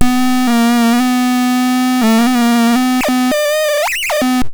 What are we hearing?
Sound effects > Electronic / Design
Optical Theremin 6 Osc dry-013
Alien, Analog, Bass, Digital, DIY, Dub, Electro, Electronic, Experimental, FX, Glitch, Glitchy, Handmadeelectronic, Infiltrator, Instrument, Noise, noisey, Optical, Otherworldly, Robot, Robotic, Sci-fi, Scifi, SFX, Spacey, Sweep, Synth, Theremin, Theremins, Trippy